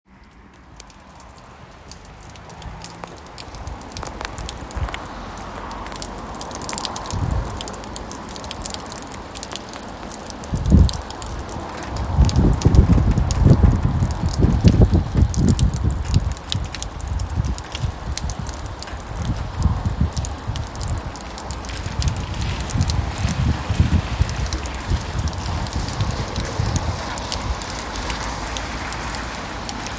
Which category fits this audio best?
Soundscapes > Urban